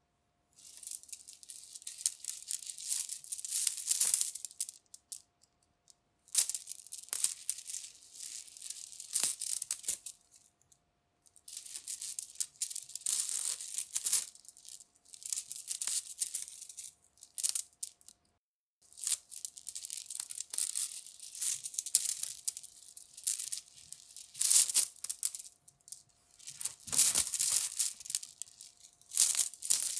Sound effects > Objects / House appliances
A string of manipulated beads. This sound can be used to recite the rosary or even to go to market, gently waving a bag of beads. * No background noise. * No reverb nor echo. * Clean sound, close range. Recorded with Iphone or Thomann micro t.bone SC 420.